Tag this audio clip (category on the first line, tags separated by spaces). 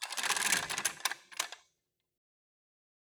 Sound effects > Other mechanisms, engines, machines
loadingdoor gears chain machinery